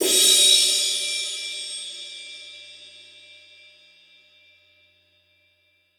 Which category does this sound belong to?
Instrument samples > Percussion